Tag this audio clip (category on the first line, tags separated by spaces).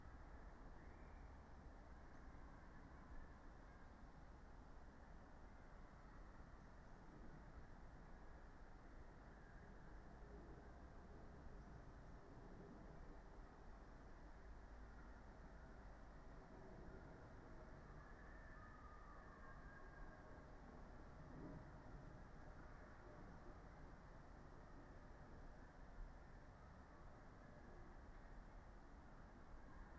Soundscapes > Nature
Dendrophone modified-soundscape artistic-intervention natural-soundscape alice-holt-forest weather-data raspberry-pi phenological-recording data-to-sound field-recording nature soundscape sound-installation